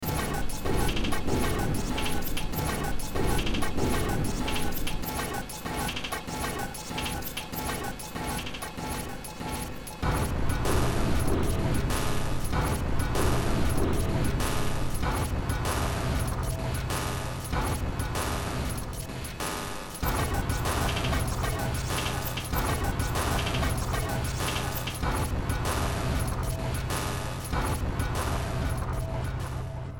Music > Multiple instruments
Short Track #3071 (Industraumatic)
Noise, Industrial, Horror, Ambient, Soundtrack, Sci-fi, Underground, Games